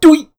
Sound effects > Human sounds and actions
A vocal boink. 'Doit'.